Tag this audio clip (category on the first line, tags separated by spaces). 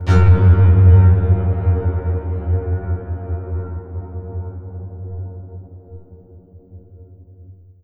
Sound effects > Electronic / Design
chilling shocking scary thrill tension fright scare burst terror tense jolt intense sudden jumpscare chill creep dramatic twist eerie menace string sting suspense danger shock panic dread looming spook fear